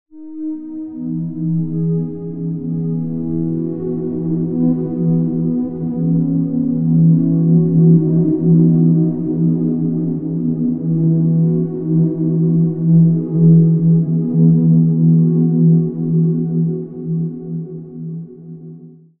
Music > Solo instrument
Made in Fl studio with Analog labs Enjoy, use for anything :)